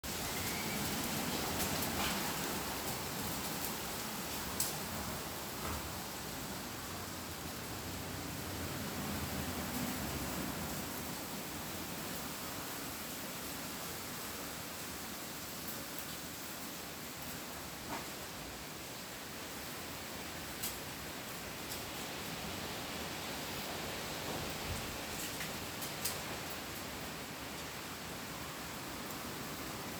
Soundscapes > Nature
Rain storm in West African city
Sounds recorded in my West African flat while a heavy rain storm occurred outside. We can hear the difference between the rain and the heavy shower. You can also hear passing traffic and ambient noise of my flat.
Domestic-sounds, heavy-rain, heavy-rain-shower, passing-Traffic, rain, rain-shower, rainstorm, storm